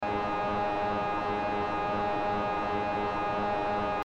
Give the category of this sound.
Sound effects > Other mechanisms, engines, machines